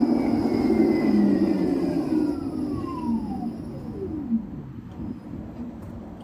Soundscapes > Urban
final tram 9
finland, tram, hervanta